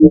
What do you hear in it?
Instrument samples > Synths / Electronic
DISINTEGRATE 8 Eb
additive-synthesis, fm-synthesis